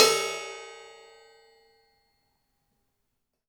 Music > Solo instrument
Cymbal Grab Stop Mute-012
Crash, Drums, Percussion, Sabian